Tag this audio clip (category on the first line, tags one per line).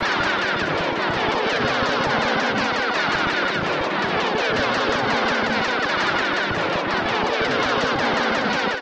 Sound effects > Electronic / Design
Futuristic
Alien